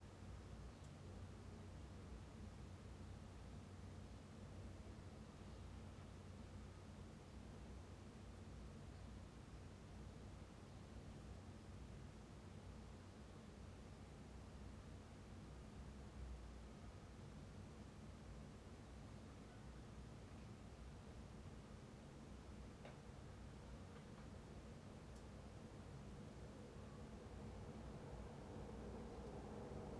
Soundscapes > Urban
interior top floor 20250428 2
Part of my "home ambience journal" pack, documenting an urban location over time under different environmental conditions. See the pack description for more about this location and its sonic characteristics. The ambiance of the top floor / garret of a house in the Central Hill neighborhood of Somerville, Massachusetts, in the Boston region. The windows are open; one on the left of the stereo image, and one, a skylight, on the right. Recorded on 2025-04-28 at about 14:50. It was a sunny, clear spring day, temperature 75F / 24C, low humidity. Audible elements:
distant traffic from the elevated I-93 highway
motor vehicles on nearby streets, including truck "back up" alarm
very faint voices and sounds of human activity
motors and fans
wind
distant passing MBTA Green Line train (mass transit trolley)
distant passing Amtrak train
distant jet aircraft
faint, distant bird calls: probably House Sparrow
Recorded with a Sony PCM-D50, with mics in the 120-degree (wide) position.